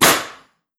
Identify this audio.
Sound effects > Other mechanisms, engines, machines
TOOLPneu-Samsung Galaxy Smartphone Nail Gun, Burst 04 Nicholas Judy TDC
A nail gun burst. Sounded like a pistol shot.
pneumatic, shot